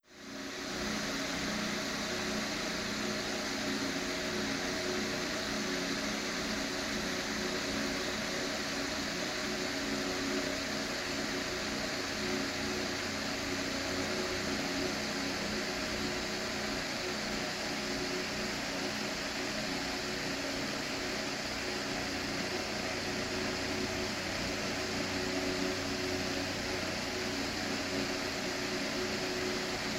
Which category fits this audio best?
Soundscapes > Indoors